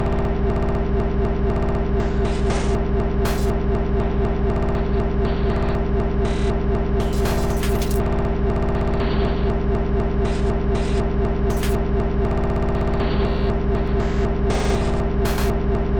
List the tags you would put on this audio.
Instrument samples > Percussion
Industrial
Samples
Weird
Loopable
Drum
Packs
Alien
Soundtrack
Ambient
Dark
Underground
Loop

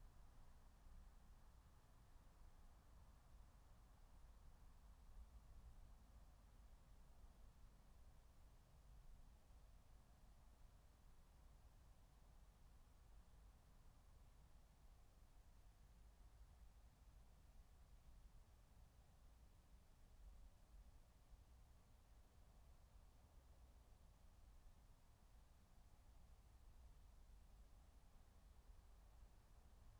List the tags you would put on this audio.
Soundscapes > Nature
meadow
natural-soundscape
nature

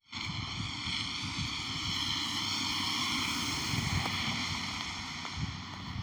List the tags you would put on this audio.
Sound effects > Vehicles
car
drive
vehicle

